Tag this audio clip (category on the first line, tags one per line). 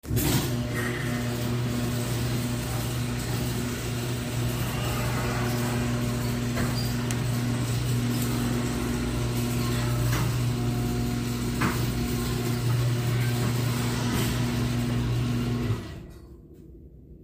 Sound effects > Other mechanisms, engines, machines
door
garage
house
overhead
shutters
warehouse